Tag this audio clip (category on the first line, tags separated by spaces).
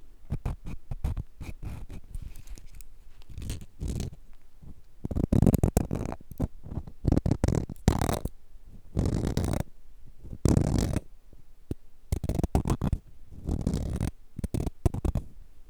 Sound effects > Objects / House appliances
Dare2025-09 Dare2025-Friction file filing-nail friction metal Nail-file rubbing